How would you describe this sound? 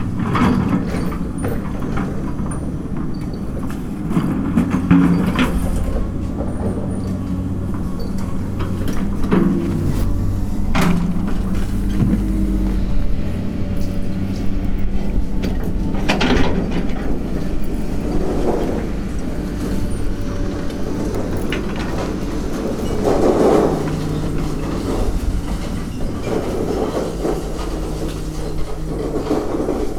Sound effects > Objects / House appliances
Junkyard Foley and FX Percs (Metal, Clanks, Scrapes, Bangs, Scrap, and Machines) 208
Metallic, Atmosphere, Clang, Foley, Clank, Junk, Bash, SFX, Ambience, Machine, scrape, trash, dumpster, FX, waste, dumping, Robot, tube, garbage, Percussion, Dump, Junkyard, Metal, Bang, Smash, Environment, rattle, Perc, rubbish, Robotic